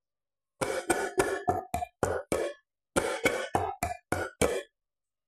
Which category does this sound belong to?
Music > Solo percussion